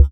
Instrument samples > Synths / Electronic
BUZZBASS 8 Db
additive-synthesis, bass, fm-synthesis